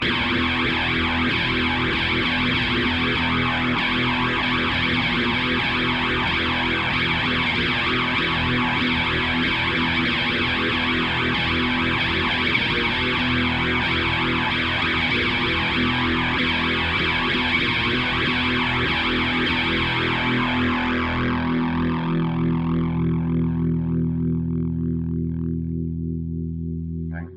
Music > Solo instrument
Metal sound
This is a riff made using amp 5 and a Fender Strato
metal, guitar, rock